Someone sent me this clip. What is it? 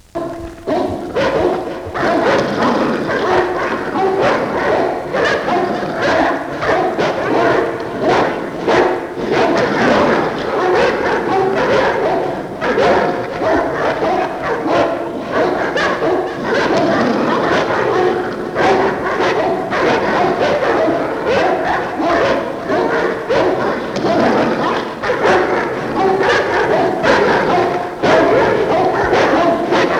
Sound effects > Animals
Dogs fighting and growling. Own record. Special effect. Sample.